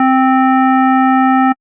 Instrument samples > Synths / Electronic
05. FM-X ODD2 SKIRT3 C3root
FM-X, MODX, Montage, Yamaha